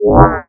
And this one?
Instrument samples > Synths / Electronic
DISINTEGRATE 2 Ab
fm-synthesis, bass, additive-synthesis